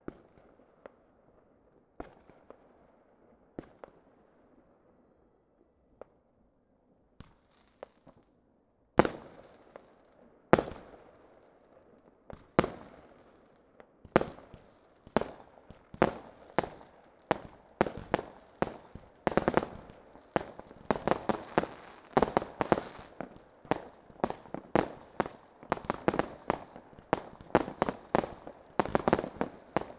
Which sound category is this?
Soundscapes > Urban